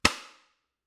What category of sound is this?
Sound effects > Objects / House appliances